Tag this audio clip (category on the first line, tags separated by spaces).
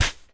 Speech > Solo speech
Mid-20s annoyed talk grumpy voice Tascam Video-game Man U67 singletake air Single-take Vocal dialogue puncture Neumann FR-AV2 Male tire NPC Human Voice-acting upset oneshot